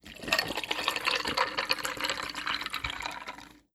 Sound effects > Objects / House appliances
FOODPour-Blue Snowball Microphone, CU Into Glass With Ice Nicholas Judy TDC
Pouring water into glass with ice.